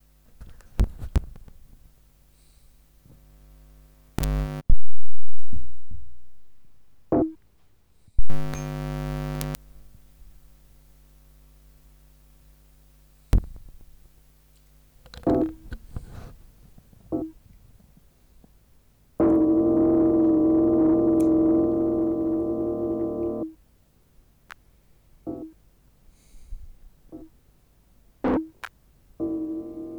Music > Multiple instruments
The sounds here are mainly taken from a synthesizer improvisation (can't remember which one, a Teenage Engineering maybe) then sampled and multitracked into a left/right anti-stereo panning, there is also some jack cable noise. Well, in Frankfurt (Germany) all is about money. Producer and friend Guido Braun nevertheless invited noise and stuff anyway there. I remember well his track with the vaccum cleaner on piano like in the good old dusty Fluxus days 100 years ago.
Improvisation, Recording, Synthesizer